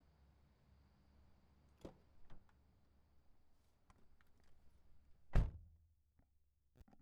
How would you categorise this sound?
Sound effects > Vehicles